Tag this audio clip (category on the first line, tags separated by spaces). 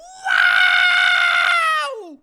Sound effects > Human sounds and actions
cartoon cute cartoonish scream